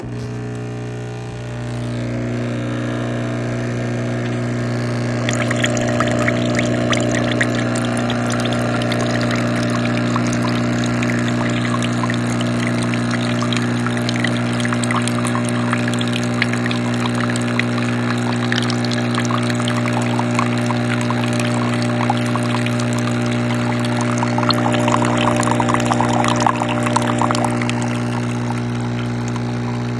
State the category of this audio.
Sound effects > Other mechanisms, engines, machines